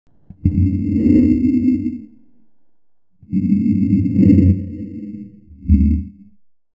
Soundscapes > Synthetic / Artificial
alien kookaburra voice slow down